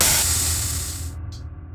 Sound effects > Electronic / Design
Impact Percs with Bass and fx-040
looming, sfx, impact, bash, crunch, theatrical, ominous, explosion, deep, fx, cinamatic, combination, low, smash, foreboding, mulit, perc, brooding, bass, explode, hit, oneshot, percussion